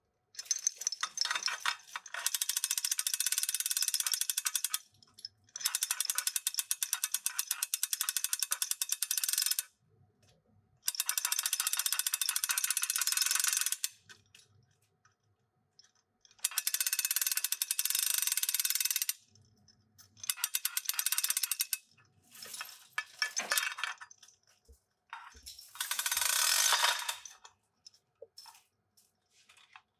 Sound effects > Other mechanisms, engines, machines
#37:56 chain rubs on the metal sounds duller Pulling on a Heavy-duty pull chain -Foley-
metal, clink, machinery, chain-rattling